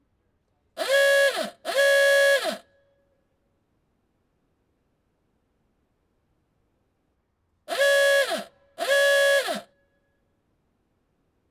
Sound effects > Vehicles
1933 Austin 10 Clifton Roadster horn, recorded on a Rode iXY at a vintage car display in New Zealand